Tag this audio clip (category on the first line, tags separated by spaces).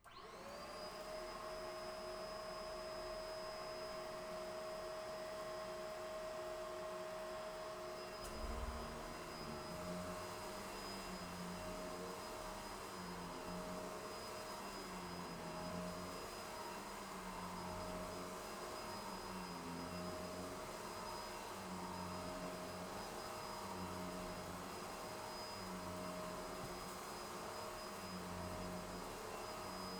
Sound effects > Objects / House appliances
distance home run turn-off turn-on vacuum vacuum-cleaner